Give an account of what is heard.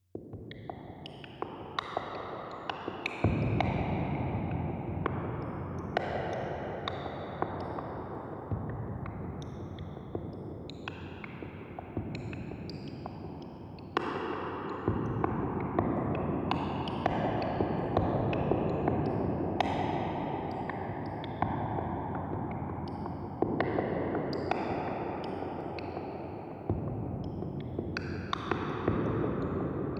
Music > Solo percussion
Perc Loop-Huge Reverb Percussions Loop 6
All samples used from phaseplant factory. Processed with Khs Filter Table, Khs convolver, ZL EQ, Fruity Limiter. (Celebrate with me! I bought Khs Filter Table and Khs convolver finally!)
Underground, Cave, Percussion, Ambient, reverb, Cinematic, Loop